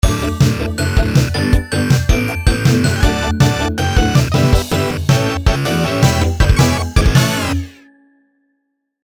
Music > Multiple instruments
Flash Animation Intro
A small bit of music reminiscent of something from a flash game.